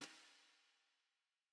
Music > Solo percussion
snare drum 14 by 6.5 inch brass ludwig recorded in the soundproofed sudio of Calupoly Humboldt with an sm57 and a beta 58 microphone into logic and processed lightly with Reaper